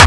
Instrument samples > Percussion
Classic Crispy Kick 1-Punch-A#
powerful, Kick, Punch, Crispy, brazilianfunk, Distorted, powerkick